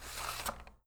Sound effects > Objects / House appliances
FOLYProp-Blue Snowball Microphone VHS Tape, Out of Cardboard Case Nicholas Judy TDC
A VHS tape sliding out of a cardboard case.
Blue-brand, Blue-Snowball, cardboard, case, slide, tape, vhs